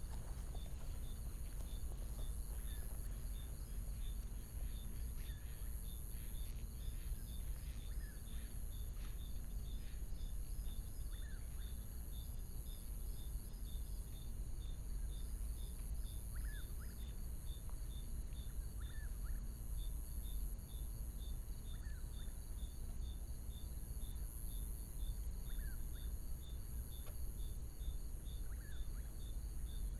Soundscapes > Urban
AMBSea-Summer Predawn along Intracoastal Waterway, waves lapping, fish jumping, crickets, frogs, passing traffic, passing boat, 445AM QCF Gulf Shores Alabama Zoom F3 with LCT 440 Pure
Predawn along the Instracoastal Waterway. Crickets, waves lapping, passing traffic, passing boat traffic, 4:45AM